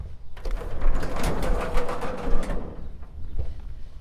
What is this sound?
Sound effects > Objects / House appliances
Garage door open
Opening a metal garage door. Recorded with Zoom H1.
garage-door, opening, Dare2025-06A, open, metal-door